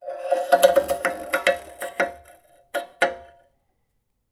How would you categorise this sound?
Sound effects > Other mechanisms, engines, machines